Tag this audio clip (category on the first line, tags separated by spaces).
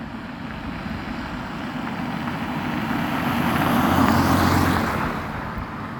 Sound effects > Vehicles
moderate-speed car studded-tires wet-road asphalt-road